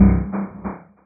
Instrument samples > Synths / Electronic
CVLT BASS 109
synthbass, sub, wobble, subbass, subs, stabs, lfo, subwoofer, wavetable, bassdrop, low, clear, bass, lowend, drops, synth